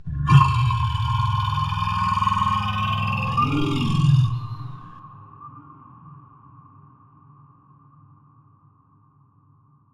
Sound effects > Experimental
Creature Monster Alien Vocal FX-11
From a collection of creature and monster alien sfx fx created by my throat singing in my studio and processing with a myriad of vsts effects in Reaper, including infiltrator, fabfilter reverb, shaperbox, and others
sfx, Otherworldly, gamedesign, boss, devil, Groan, Growl, Reverberating, Ominous, Vocal, Vox, evil, Monstrous, Snarling, fx, visceral, Creature, Echo, Fantasy, Snarl, Sound, Sounddesign, Deep, scary, Frightening, Alien, gutteral, Animal, Monster, demon